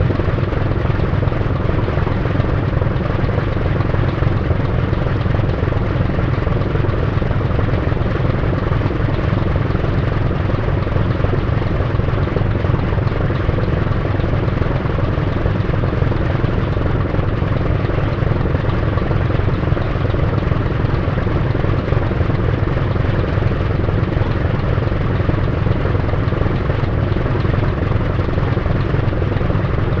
Sound effects > Other mechanisms, engines, machines
250801 194021 PH Ferry boat engine
Ferryboat engine. Recorded between Calapan city and Batangas city (Philippines), in August 2025, with a Zoom H5studio (built-in XY microphones).
ambience, atmosphere, boat, container-ship, diesel, engine, ferry, ferryboat, field-recording, loud, machine, machinery, mechanism, motor, motorboat, noise, noisy, Philippines, seaman, seamen, ship, tanker